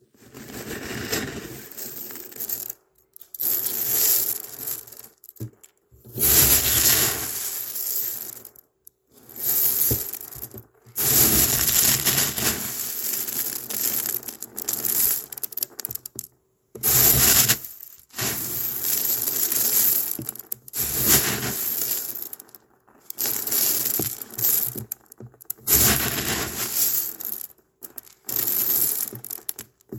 Sound effects > Objects / House appliances
FOODIngr-Samsung Galaxy Smartphone, CU Rice, Scoop, Dig Nicholas Judy TDC
Rice scooping and pouring.
foley, Phone-recording, pour, rice, scoop